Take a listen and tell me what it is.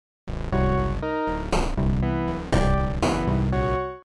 Music > Multiple instruments
Hard at Work Music Melody Loop
A little UltraBox melody, sounds like pixel dwarves mining in a pixel cave for pixel goblin's gold.